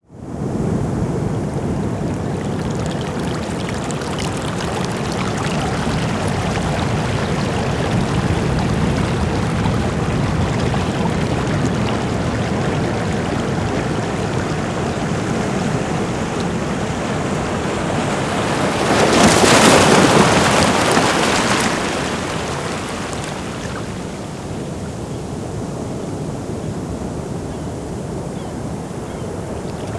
Soundscapes > Nature
Mar entre rocas Puchuncavi
Ambient sound of rocks on the sea shore.
Chile, sea, america, rocks, Puchuncavi, Valparaiso, south, field, recording